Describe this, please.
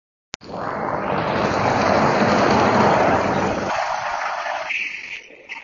Soundscapes > Urban
Bus passing by 13
Where: Tampere Keskusta What: Sound of a bus passing by Where: At a bus stop in the morning in a mildly windy weather Method: Iphone 15 pro max voice recorder Purpose: Binary classification of sounds in an audio clip